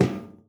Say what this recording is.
Instrument samples > Percussion
drum-000 snare2
My sister's snare2 with damper rubber ring. And I've removed the reverb.
1-shot, drum, snare